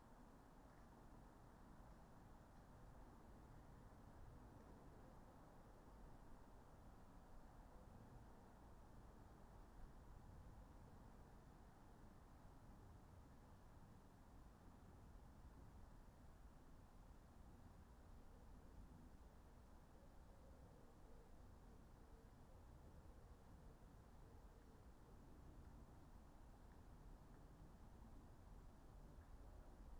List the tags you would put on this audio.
Soundscapes > Nature
raspberry-pi
soundscape
alice-holt-forest
natural-soundscape
data-to-sound
phenological-recording
modified-soundscape
artistic-intervention
weather-data
sound-installation
nature
Dendrophone
field-recording

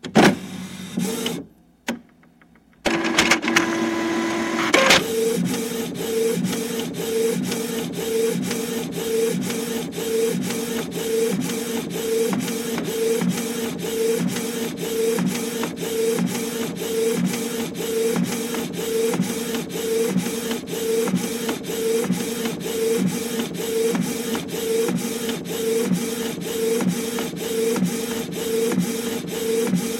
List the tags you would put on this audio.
Sound effects > Objects / House appliances
robot
office
work
ink-jet
Inkjet
technology
ASMR
Canon
computer
Pixma
nostalgia
nk-jet
printing
Canon-Pixma
mechanical
printer

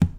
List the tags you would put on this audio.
Sound effects > Objects / House appliances
bucket
container
debris
drop
handle
hollow
household
liquid
metal
plastic
pour
slam

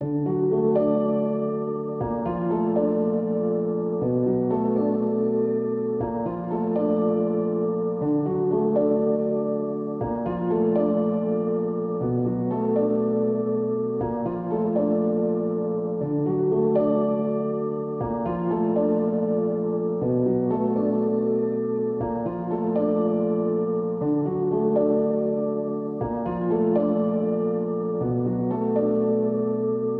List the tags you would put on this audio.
Music > Solo instrument
free
simplesamples
reverb
loop
piano
120bpm
simple
pianomusic
music
samples
120

